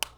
Sound effects > Objects / House appliances
OBJWrite-Blue Snowball Microphone Crayola Washable Dry Erase Marker, Close Top Nicholas Judy TDC
A crayola washable dry erase marker closing it's top.